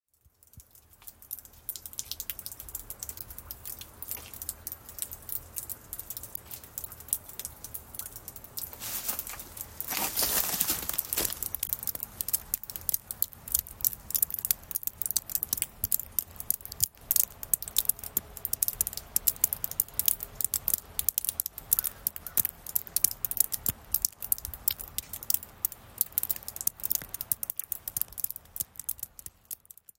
Soundscapes > Nature
Ice melting, a dripping icicle, a snowy crunch